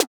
Instrument samples > Synths / Electronic
A snare one-shot made in Surge XT, using FM synthesis.
synthetic, surge, fm, electronic